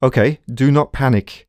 Speech > Solo speech
Fear - okay do not panic
dialogue, do, fear, FR-AV2, Human, Male, Man, Mid-20s, Neumann, not, NPC, okay, oneshot, panic, singletake, Single-take, talk, Tascam, U67, Video-game, Vocal, voice, Voice-acting